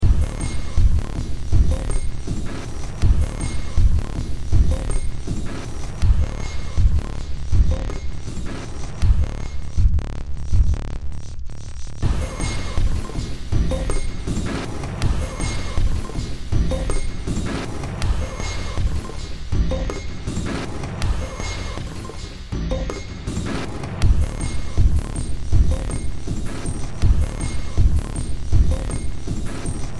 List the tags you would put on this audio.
Music > Multiple instruments
Ambient
Sci-fi
Games
Noise
Underground
Horror
Cyberpunk
Soundtrack
Industrial

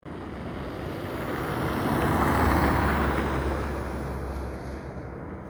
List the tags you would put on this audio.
Sound effects > Vehicles
vehicle
engine
car

